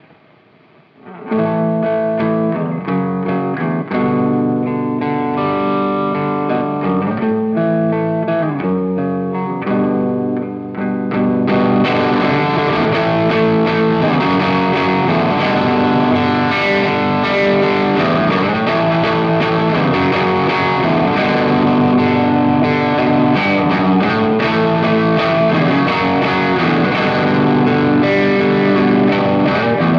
Music > Solo instrument
grunge alternative guitar 167bpm 2
I record this with a faim stratocaster and a few beautiful weirdos pedals My pedalboard Behringer graphic eq700 Cluster mask5 Nux Horse man Fugu3 Dédalo Toxic Fuzz Retrohead Maquina del tiempo Dédalo Shimverb Mooer Larm Efectos Reverb Alu9 Dédalo Boss Phase Shifter Mvave cube baby 🔥This sample is free🔥👽 If you enjoy my work, consider showing your support by grabbing me a coffee (or two)!
rock, grunge, shoegaze, alternative, power, electric, riff, chord, guitar